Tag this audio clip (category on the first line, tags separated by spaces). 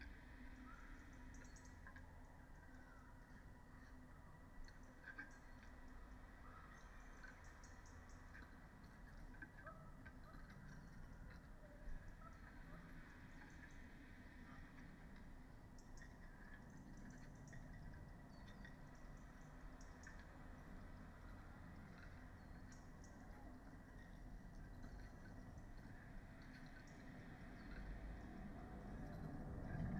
Soundscapes > Nature
weather-data raspberry-pi natural-soundscape sound-installation phenological-recording nature data-to-sound modified-soundscape field-recording Dendrophone soundscape artistic-intervention alice-holt-forest